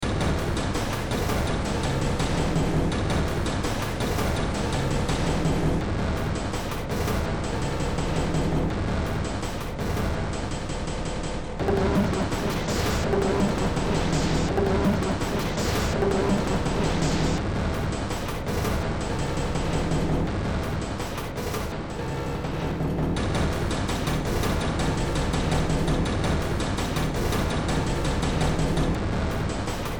Music > Multiple instruments
Short Track #3958 (Industraumatic)
Ambient, Cyberpunk, Games, Horror, Industrial, Noise, Sci-fi, Soundtrack, Underground